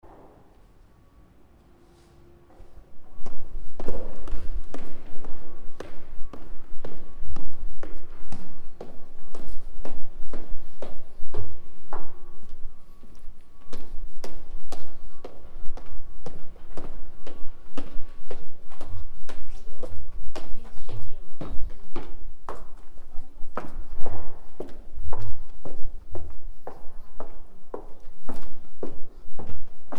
Sound effects > Human sounds and actions
Descent of the stairs in the library of the Faculty of Arts and Humanities at the University of Porto. The recording was made using a Tascam DR-40X recorder